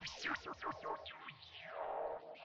Soundscapes > Synthetic / Artificial
LFO Birdsong 10
birds, massive, Lfo